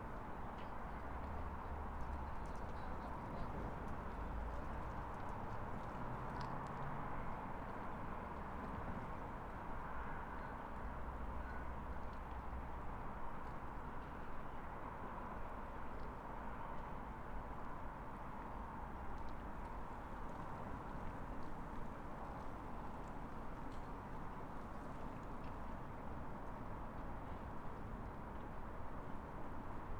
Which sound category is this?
Soundscapes > Urban